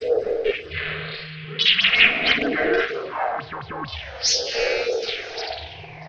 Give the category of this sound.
Soundscapes > Synthetic / Artificial